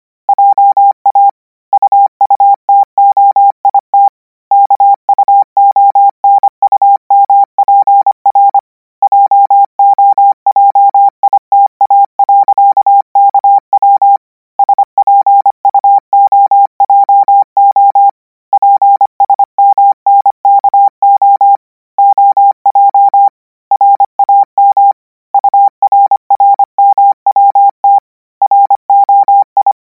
Sound effects > Electronic / Design
Koch 15 KMRSUAPTLOWI.NJ - 500 N 25WPM 800Hz 90%
Practice hear characters 'KMRSUAPTLOWI.NJ' use Koch method (after can hear charaters correct 90%, add 1 new character), 500 word random length, 25 word/minute, 800 Hz, 90% volume. a . n p. sarllumws u.omu kwru joi moojnor irslsai omsi..ilk lpmuroas susn aokojus .n o kowt lkpwrs rnoa.r no.untaw. jmjlwlaa aiati j.usaruu sup lsktittnk uiot rs lkai.jl ls.joawr poiam m.wlpui .spk akmasna skktnmww wip.u.mta rip lnwjrk tssjrm u.rkkku nnussjp mpku. u mkikratto jomwp lsmurj. pupwa ipijnau.u nwktpn pl wrjka.u kwt lwwjmt a.rluojwt sjlpplsw. wjjnko jwlr .imrat.au u nramnwl ottmml um uan.tu im uaprls nnu k alu mlnsuummi . nnu ini ooa k.lnanspn mspsiotoo t os aonjjup uuorlklrr k p u irjoion i.krltp. ktskkao .nmts w.kioww njwktrpu. s jsnkaijt olaplmtw rlw ooptpsj u asawpioma puitspiat rlllkjuj l asomoa wsa tmmwjulnr pritl nokwjuu aowlutmi o jnjamoiw.